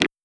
Sound effects > Human sounds and actions
LoFiFootsteps Stone Running-01
jogging, run, jog, footstep, rocks, steps, stone, synth, lofi, running